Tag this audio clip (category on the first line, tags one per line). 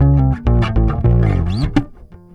Instrument samples > String
pluck
oneshots
fx
mellow
plucked
funk
electric
bass
riffs
loops
charvel
loop
blues
slide
rock